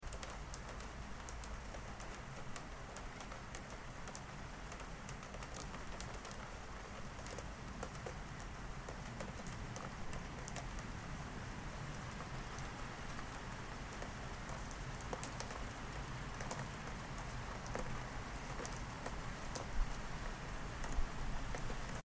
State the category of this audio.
Sound effects > Other